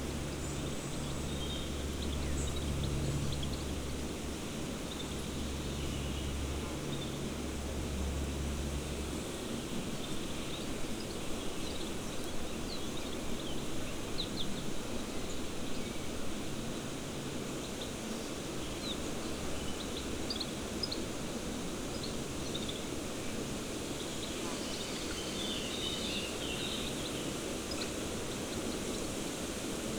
Soundscapes > Nature
Birds and rain sounds
Birds sounds in the village and soon it started to rain
birds, field-recording